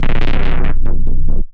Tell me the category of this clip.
Instrument samples > Synths / Electronic